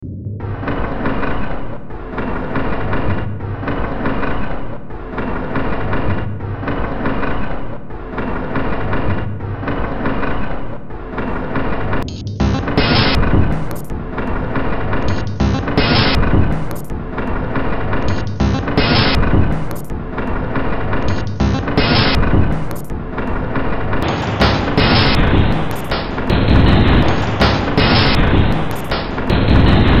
Music > Multiple instruments
Demo Track #3838 (Industraumatic)
Underground, Soundtrack, Cyberpunk, Sci-fi, Ambient, Games, Horror, Noise, Industrial